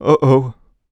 Speech > Solo speech
Fear - uh ohh
dialogue, fear, FR-AV2, Human, Male, Man, Mid-20s, Neumann, NPC, oh, oneshot, scared, singletake, Single-take, sound, talk, Tascam, U67, uh, Video-game, Vocal, voice, Voice-acting